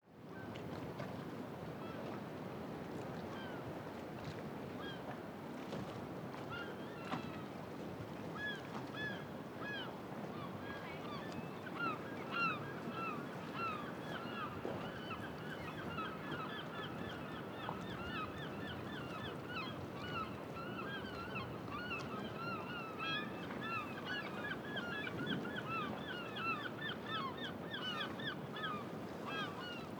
Soundscapes > Nature
General ambiance Clapoti, seagulls, some sporadic voices and cough.